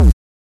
Instrument samples > Percussion
BrazilFunk Kick 32-Processed
Synthed with phaseplant only. Layered noise with 3xOSC. Well, it actually is a failed botanica bass I made with Vocodex FX. But I try to save it with different way, so I put it in to FL studio sampler to tweak pitch, pogo and boost randomly. Yup, a stupid sample.
BrazilFunk, Distorted, Kick, Sub